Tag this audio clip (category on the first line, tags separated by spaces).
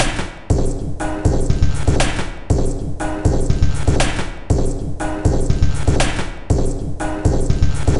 Instrument samples > Percussion
Drum Loop